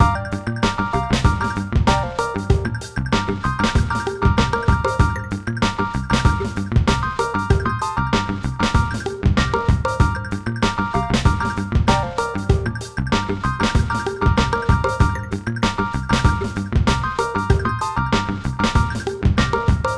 Music > Multiple instruments
Drip Groove Bop
A dynamic loop blending punchy drums, piano, and slapping bass. Perfect for those who crave a mix of swing, groove, and electronic vibes.
bass, beat, bop, drum, dynamic, electronic, groove, loop, piano, sample, slaps, swing, vibe